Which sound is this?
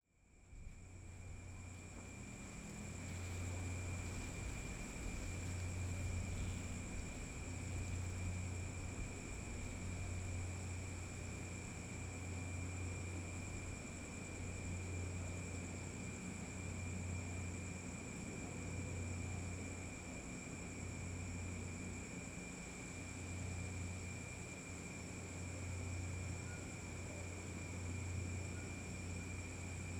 Soundscapes > Nature
250729 2926 PH Breezy night in a calm Filipino suburban area
Breezy night atmosphere in a calm Filipino suburban areas. (take 1) I made this recording at about 1:25AM, from the terrace of a house located at Santa Monica Heights, which is a costal residential area near Calapan city (oriental Mindoro, Philippines). One can hear the atmosphere of this place during a warm breezy night, with light wind in the leaves and plants, crickets and other insects chirping, and in the distance, some machineries, cows mowing, dog barking, and more. Recorded in July 2025 with an Olympus LS-P4 and a Rode Stereo videomic X (SVMX). Fade in/out applied in Audacity.